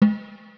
Music > Solo percussion
Snare Processed - Oneshot 154 - 14 by 6.5 inch Brass Ludwig
drums, hits, reverb, rimshots, sfx, drum, acoustic, snares, realdrums, drumkit, oneshot, rimshot, snareroll, ludwig, percussion, fx, flam, kit, hit, realdrum, perc, processed, snaredrum, snare, roll, rim, crack, beat, brass